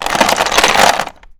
Sound effects > Objects / House appliances
ice cubes movement in tray7
cubes, ice, tray